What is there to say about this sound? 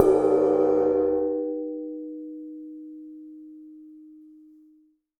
Music > Solo instrument
Paiste 22 Inch Custom Ride-016
22inch, Custom, Cymbal, Cymbals, Drum, Drums, Kit, Metal, Oneshot, Paiste, Perc, Percussion, Ride